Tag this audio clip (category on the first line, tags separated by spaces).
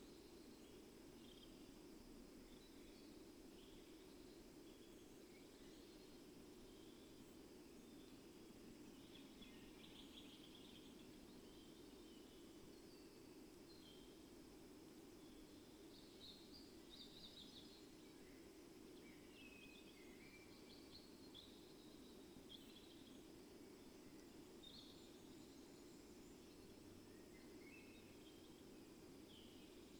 Soundscapes > Nature
raspberry-pi modified-soundscape sound-installation phenological-recording field-recording weather-data nature Dendrophone natural-soundscape soundscape data-to-sound artistic-intervention alice-holt-forest